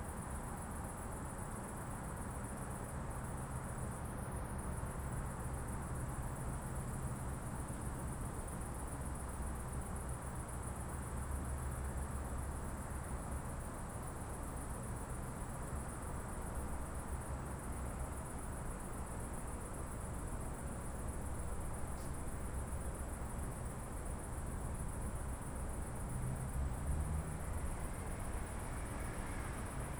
Soundscapes > Urban
Street noise in 5.1 format
Urban street noise recorded in ambisonic format. Recoded into standard 5.1 format. The six-channel file has the following channel order: left, right, center, LFE, left surround, right surround. Recorded using a Rode NT-SF1 microphone and a ZOOM F8N Pro recorder.
atmo, background-sound, birds, cars, chirping, cicadas, houses, rustling, soundscape, suburb, summer